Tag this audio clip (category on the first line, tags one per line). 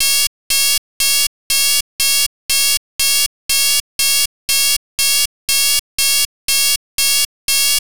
Sound effects > Electronic / Design
alarm
alert
danger
digital
scifi
warning